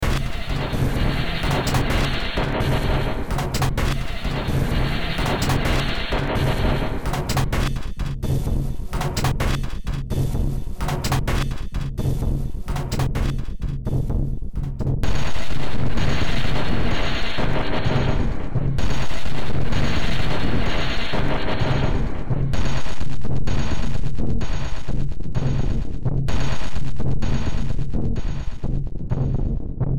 Music > Multiple instruments
Demo Track #3927 (Industraumatic)
Ambient, Cyberpunk, Games, Horror, Industrial, Noise, Sci-fi, Soundtrack, Underground